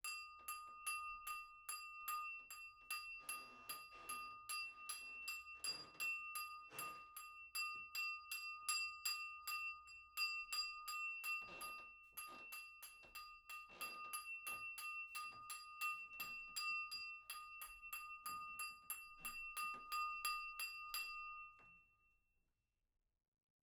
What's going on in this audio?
Sound effects > Other
Glass applause 9 (too many footsteps noise)
individual, FR-AV2, stemware, clinging, Rode, applause, Tascam, indoor, solo-crowd, wine-glass, person, XY, cling, single, glass, NT5